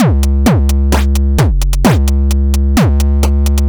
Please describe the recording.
Music > Solo percussion
8 bit-Sample Preview Drum Loops3
Synthed with phaseplant only. I just used a sine wave and external distortion fx.
game, FX, Loops, percussion, Preview, 8-bit